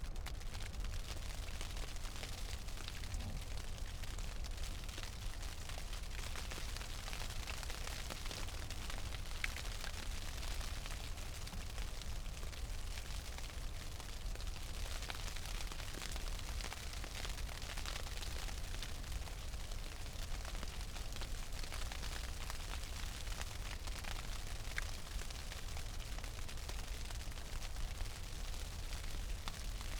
Soundscapes > Nature
Hail and Snow on Tent
This is a recording of a snowstorm from within a tent at base camp on Toubkal, Morocco. The equipment used was a pair of Primo EM272s slung from the washing line just below the ceiling of the tent and recorded into a Zoom F3. Recording made 13th - 14th May, around midnight. The intensity of the storm ebbs and flows with greatest intensity near the start. Other sounds heard are the wind flapping the walls of the tent and the roar of the nearby river in the Mizane Valley (Oued Rheraya). Voices heard are some noisy Lithuanians in a neighbouring camp. There are also occasional voices speaking Arabic. This was recorded during the night so I'm afraid I fell asleep during the recording and some of that is heard - I don't snore though. The picture shown is from the tent earlier in the day before the snowing started.
snow, weather, snowstorm, hailstones, campsite, tent